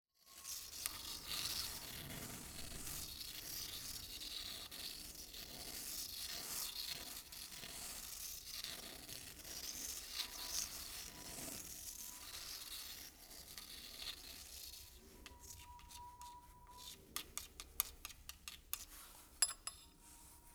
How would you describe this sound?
Sound effects > Objects / House appliances
shells and quartz crystals delicately scraping marble and tapping ceramic plate
quartz crystal foley scrape drag sfx fx perc percussion tink ceramic glass natural
quartz, glass, perc, sfx, scrape, percussion, tink, crystal, natural, drag, ceramic, fx